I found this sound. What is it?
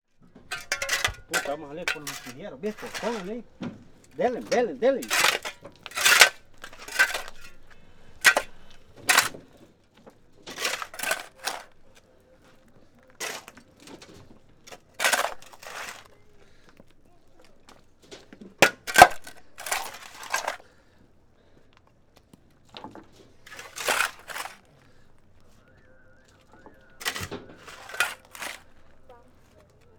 Soundscapes > Urban
Obrero trabaja con pala Honduras
A person digs with a shovel in San Juancito, Honduras. In the late 19th century, it was one of the most important silver and gold mining centers in Central America, operated by a New York-based company.
construction, field-recording, honduras, PERCUSIVE, shovel, tegucigalpa, worker, working